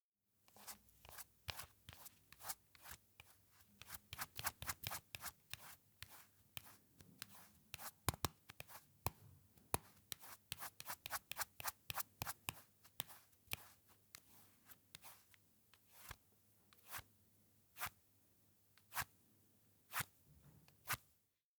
Sound effects > Objects / House appliances
COMCell Cinematis RandomFoleyVol5 Peripherals Phone Browsing
Soft, intimate phone scrolling and taps with quiet, responsive touch textures. This is one of the several freebies from my Random Foley | Vol.5 | Peripherals | Freebie pack.
taps, browsing, intimate, subtle, touchscreen, peripheral, navigation, scrolling, phone, foley